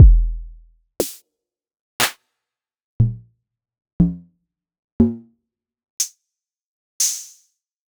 Instrument samples > Percussion
Lucia Drum Kit #009
Self contained drum kit made of 8 samples equally spaced. It has kick, snare, woodblock, rimshot, thwack, cowbell, closed hihat and open hihat. It was created with the Ruismaker app. To use them, you can either chop them or, as I do, use a grid/split function and select one of the 8 slices to play.
cowbell, drum, hihat, kick, kit, rimshot, synth, thwack, tom, woodblock